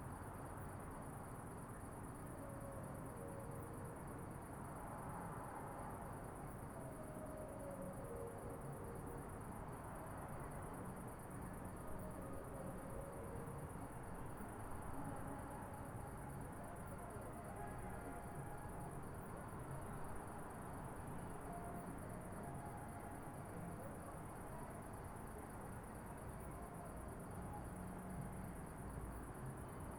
Soundscapes > Urban
Night Ambience with Distant Funfair
Recorded from a balcony in a quiet residential area with a Zoom H6 Essential. You can hear a distant funfair in the background, occasional dogs barking, some light traffic, and the gentle sound of a cool night breeze. A calm but lively night atmosphere.
carnival, funfair, town